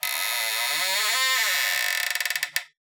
Sound effects > Other mechanisms, engines, machines
Squeaky Hinge
squeaky cupboard hinge variations